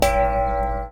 Sound effects > Objects / House appliances
Junkyard Foley and FX Percs (Metal, Clanks, Scrapes, Bangs, Scrap, and Machines) 12
Ambience, Atmosphere, Bang, Bash, Clang, Clank, Dump, dumping, dumpster, Environment, Foley, FX, garbage, Junk, Junkyard, Machine, Metal, Metallic, Perc, Percussion, rattle, Robot, Robotic, rubbish, scrape, SFX, Smash, trash, tube, waste